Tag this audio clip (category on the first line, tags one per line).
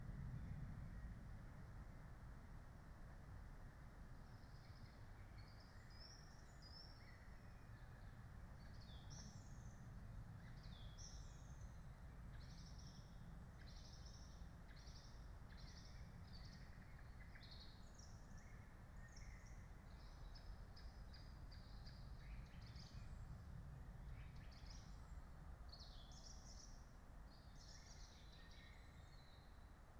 Soundscapes > Nature
data-to-sound
artistic-intervention
natural-soundscape
phenological-recording
raspberry-pi
modified-soundscape
field-recording
Dendrophone
alice-holt-forest
weather-data
soundscape
nature
sound-installation